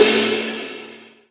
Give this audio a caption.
Instrument samples > Percussion
crash bass XWR 3
Istanbul, shimmer, Stagg, polycrash, Soultone, crunch, sinocymbal